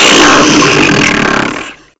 Sound effects > Human sounds and actions
Big Fart
I needed to let one out so I decided to record it.
Butt, Fart, Poopoo